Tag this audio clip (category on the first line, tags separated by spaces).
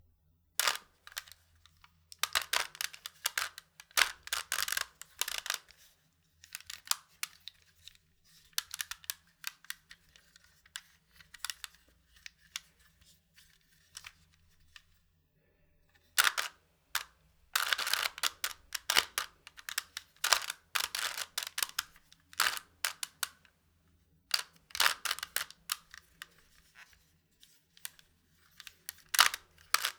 Sound effects > Objects / House appliances
squeaking plastic toy Rubiks creaking scratch